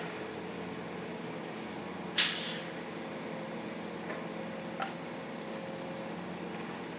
Sound effects > Vehicles
VEHCnst Street Construction Cement Mixer Truck Air Brake Usi Pro AB RambleRecordings 001
This is the sound of a cement mixer's brakes after parking to pour cement for street repair. This was recorded in downtown Kansas City, Missouri in early September around 13:00h. This was recorded on a Sony PCM A-10. My mics are a pair of Uši Pros, mounted on a stereo bar in an AB configuration on a small tripod. The mics were placed in an open window facing the street where some road construction was happening. The audio was lightly processed in Logic Pro, The weather was in the low 20s celsius, dry, and clear.
site, vehicle, cement-mixer, construction-site, cement, concrete, construction, hiss